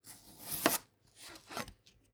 Sound effects > Other
Long slice vegetable 1
Chief, Indoor, Slice, Cut, Chef, Home, Cooking, Knife, Cook, Vegetable, Kitchen